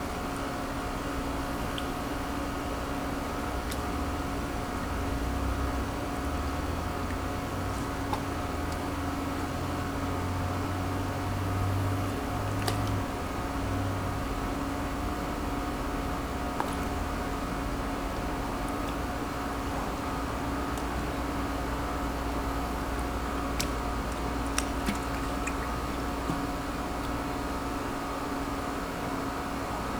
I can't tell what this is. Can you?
Soundscapes > Urban
The poolside courtyard of a condo complex on West Beach, Gulf Shores, Alabama. AC Hum, crickets, passing traffic (**Geolocation Note: Complex isn't on map, newer construction, marker is correct)